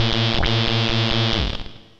Instrument samples > Synths / Electronic
Benjolon 1 shot26
CHIRP, DRUM